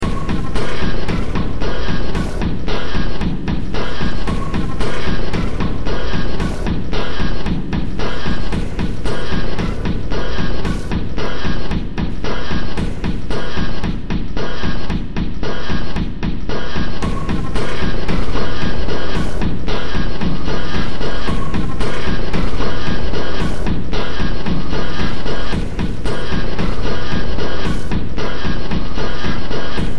Music > Multiple instruments
Demo Track #3758 (Industraumatic)
Underground, Horror, Cyberpunk, Games, Soundtrack, Industrial